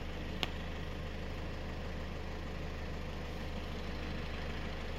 Sound effects > Other mechanisms, engines, machines
clip auto (17)
Auto, Avensis, Toyota